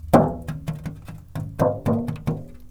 Sound effects > Other mechanisms, engines, machines
Woodshop Foley-089
thud, knock, sound, bam, perc, crackle, shop, oneshot, percussion, little, fx, rustle, foley, tools, strike, wood, boom, sfx, bop, pop, tink, bang, metal